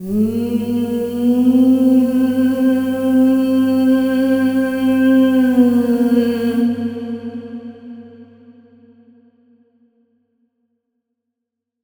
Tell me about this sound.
Speech > Solo speech
A pretty and soulful female vocal line with big reverb. Vocals by Kait Ryan recorded at Studio CVLT in Arcata, CA. Recorded with a Sure SM57a microphone into an AudioFuse Studio interface, Processed with Reaper and Fab Filter VSTs.